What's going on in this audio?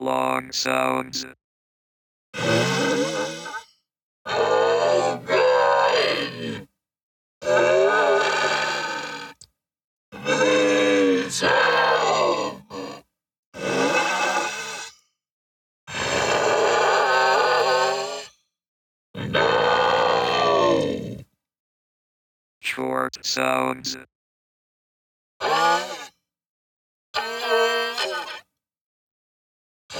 Sound effects > Human sounds and actions
Zombie sounds compilation
These sounds were recorded and processed in DAW; By recording my voice (or.. my growls) three times at once and by layering a few cool super duper sound effects i was able to create these, uhm.. Growls? Or roars. Anyway, they sound like zombies or mutants so yeah, use it for horror'ish stuff. - The audio file contains TWO parts, (separated by text-to-speech plugin) first one - #0:02 - with LONG growls and some words; second one - #0:25 - with SHORT roars.. Growls i mean. - Ы.